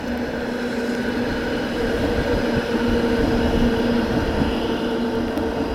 Sound effects > Vehicles
Tram 2025-11-02 klo 13.27.55
Sound recording of a tram passing by. Recording done in Hervanta, Finland near the tram line. Sound recorded with OnePlus 13 phone. Sound was recorded to be used as data for a binary sound classifier (classifying between a tram and a car).
Finland, Public-transport, Tram